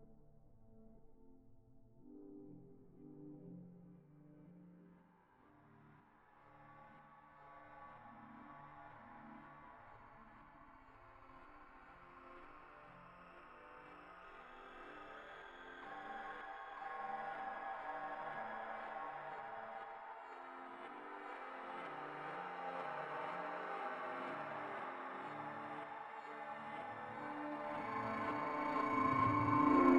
Sound effects > Electronic / Design
A simple fx riser with harmonic texture, done with reaktor 5.
harmonic, riser, sound-effect, fx